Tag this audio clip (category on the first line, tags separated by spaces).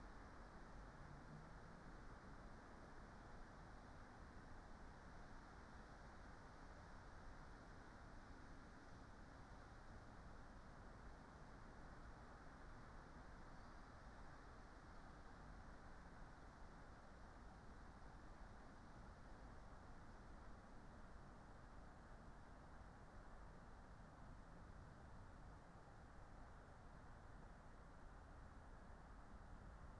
Soundscapes > Nature
phenological-recording soundscape sound-installation alice-holt-forest weather-data field-recording data-to-sound artistic-intervention nature natural-soundscape raspberry-pi Dendrophone modified-soundscape